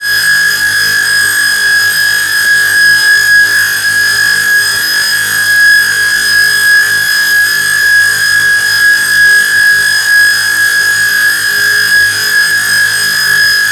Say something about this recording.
Sound effects > Electronic / Design
Sawing Atomosphare 3
I synth it with phasephant and 3xOSC! I was try to synth a zaag kick but failed, then I have a idea that put it into Granular to see what will happen, the result is that I get this sound.
Ambient, IDM, Industry, Saw